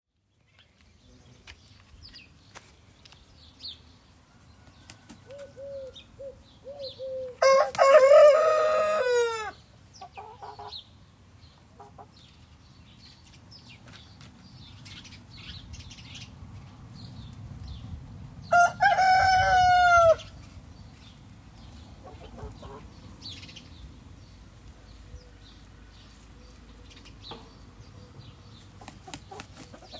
Soundscapes > Nature
Corfu - Cockrel in the Morning, Pigeons, Nature
Field-recording made in Corfu on an iPhone SE in the summer of 2025.